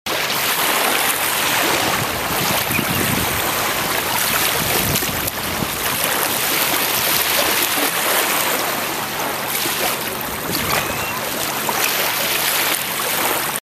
Sound effects > Natural elements and explosions
Beach coastline - meditation sound effect.
beach, field-recording, lake, nature, sea, water, waves